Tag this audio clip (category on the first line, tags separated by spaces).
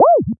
Sound effects > Animals
stinger sound-design electronic game doggy video-game sfx funny strange effect bleep short